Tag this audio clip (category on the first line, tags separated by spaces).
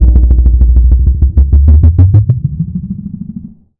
Sound effects > Experimental
Pulsating creepy monster scary pattern african horror thriller foreboding frog Repeating suspensful